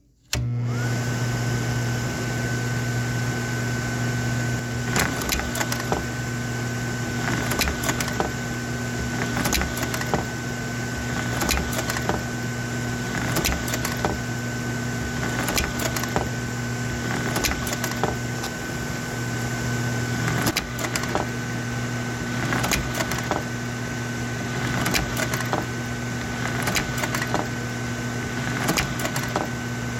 Sound effects > Other mechanisms, engines, machines
A slide projector fan running with changes.